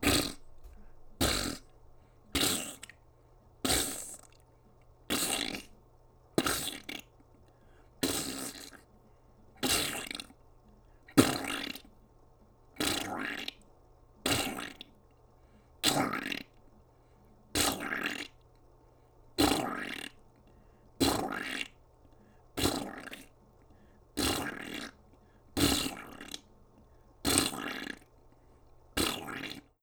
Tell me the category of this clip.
Sound effects > Human sounds and actions